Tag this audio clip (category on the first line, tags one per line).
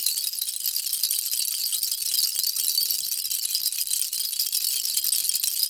Sound effects > Natural elements and explosions
beach
Shellphone
Shells
nature-sounds